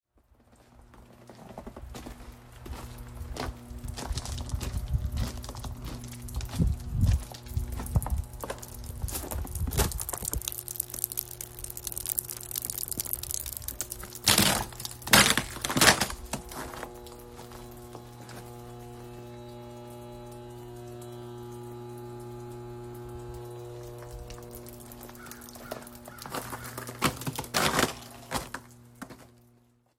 Soundscapes > Other
Winter Walk
Walk through rocks and snow to a dripping icicle and large transformer
winter, field-recording, ice